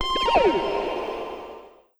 Sound effects > Electronic / Design
XD Springs
A welcome lil ringtone/chime, made on a Korg Minilogue XD, processed in Pro Tools. A quick lil motif, with added spacey reverb.
sfx, gui, ringtone, processed, synth, bleep, Korg, beep, Minilogue-XD, game, effect, click, ui, digital, computer, electronic, chirp, blip